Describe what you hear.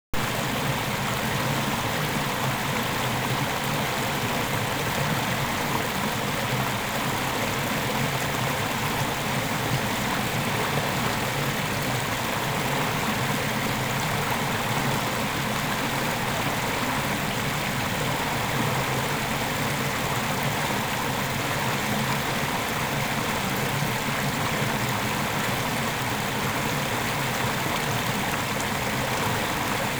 Soundscapes > Nature
Concrete boxes waterfall
Water flows through rectangular concrete boxes. Park, river bank. 2025-09-20 16.37.56 Stereo. Recorded on a mobile phone.
nature, water